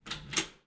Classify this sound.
Sound effects > Objects / House appliances